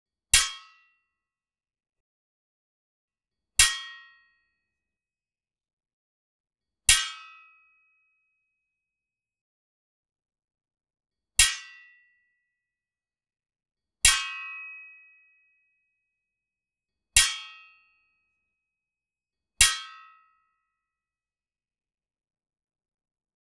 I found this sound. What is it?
Sound effects > Objects / House appliances
custom TMNT 2012 inspired broad sword clash sounds 07032025
sounds of cleaver knife on my older brother's new metal cleaver knife. did some processing with 2 hits being the original and the other slow pitched down version.